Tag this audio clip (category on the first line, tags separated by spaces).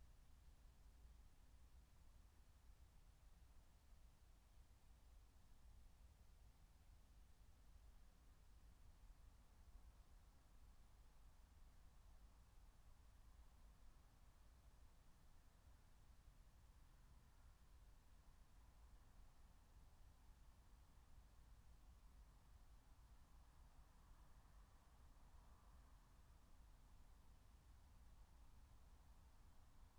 Soundscapes > Nature
soundscape natural-soundscape phenological-recording field-recording meadow alice-holt-forest nature raspberry-pi